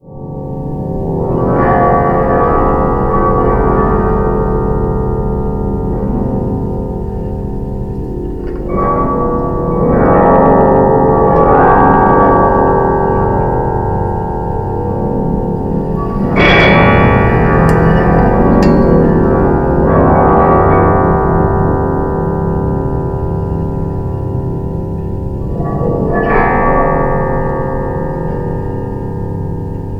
Soundscapes > Other
Text-AeoBert-Pad-07
strings, dischordant, swells, wind